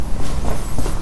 Sound effects > Other mechanisms, engines, machines
Woodshop Foley-082
bam, bang, boom, bop, crackle, foley, fx, knock, little, metal, oneshot, perc, percussion, pop, rustle, sfx, shop, sound, strike, thud, tink, tools, wood